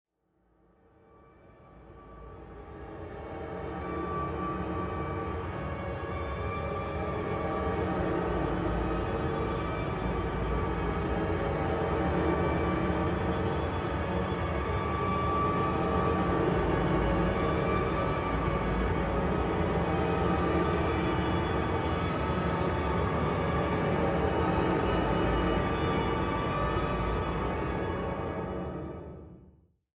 Soundscapes > Synthetic / Artificial
Laboratory - Horror Background
Creepy and suspenseful background music, perfect for horror films, games, and scary scenes.
atmospheric, background, chilling, cinematic, creepy, dark, eerie, fear, haunting, horror, mysterious, ominous, scary, sinister, spooky, suspense, thriller